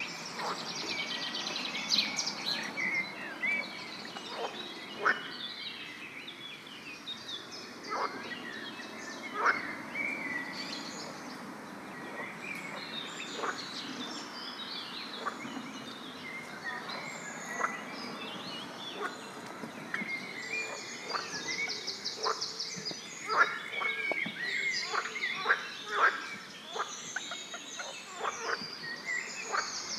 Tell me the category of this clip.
Soundscapes > Nature